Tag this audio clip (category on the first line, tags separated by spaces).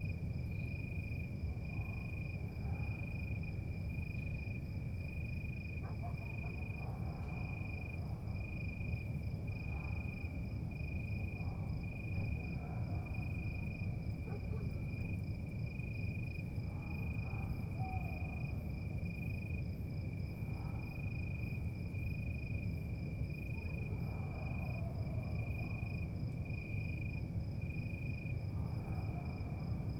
Soundscapes > Nature
Ambience Barking Crickets Dogs Farm Night Owl Portugal Rural Summer